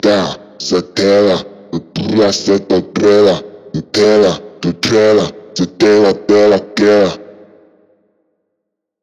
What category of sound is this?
Music > Other